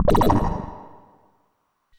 Instrument samples > Synths / Electronic
Benjolon 1 shot33
CHIRP, MODULAR, NOISE, 1SHOT, DRUM, SYNTH